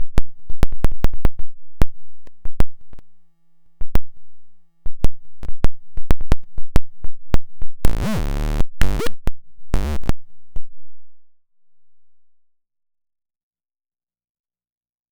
Sound effects > Electronic / Design
Optical Theremin 6 Osc dry-109
Electro, DIY, Electronic, Dub, Handmadeelectronic, Spacey, SFX, Bass, noisey, Robotic, Digital, Otherworldly, Experimental, Noise, Theremins, Analog, Theremin, Sci-fi, Glitchy, Synth, Optical, Infiltrator, Glitch, Sweep, Instrument, Robot, Alien, FX, Trippy, Scifi